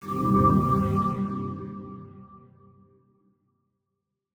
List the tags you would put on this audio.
Sound effects > Electronic / Design
fantasy UI scifi

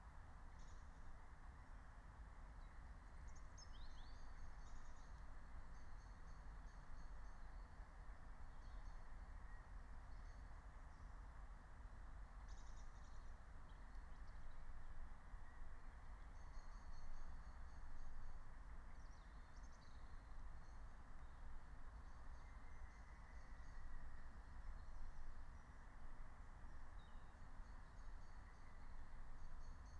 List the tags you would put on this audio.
Soundscapes > Nature
alice-holt-forest nature natural-soundscape raspberry-pi meadow phenological-recording field-recording soundscape

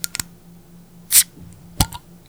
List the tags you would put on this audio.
Sound effects > Objects / House appliances
bottlecap open close keychain cap glass key-chain bottle metal bottle-opener soda bottle-cap uncorking opening beer-bottle close-proximity beer

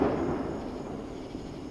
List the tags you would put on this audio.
Sound effects > Other
explosions experimental sample-packs United-States fireworks-samples samples sfx electronic independence fireworks free-samples america patriotic day

